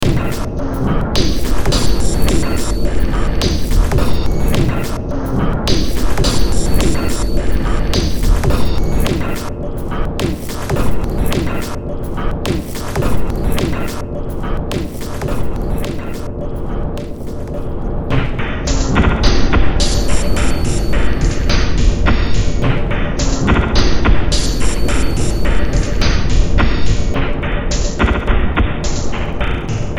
Music > Multiple instruments
Demo Track #3116 (Industraumatic)
Sci-fi, Horror, Games, Industrial, Soundtrack, Cyberpunk, Ambient, Underground, Noise